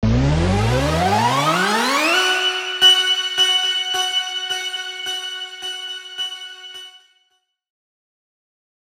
Sound effects > Electronic / Design
CLASSIC RISER BOUNCE
A custom, nine-second EDM-style riser with a bouncy delay tail at 107bpm.
crescendo, design, EDM, effect, fx, rise, riser, sfx, sound, sound-design, sounddesign, sound-effect, soundeffect, swell, transition